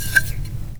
Music > Solo instrument
Marimba Loose Keys Notes Tones and Vibrations 30-001
percussion, oneshotes, marimba, block, woodblock, wood, tink, thud, notes, loose, rustle, foley, keys, perc, fx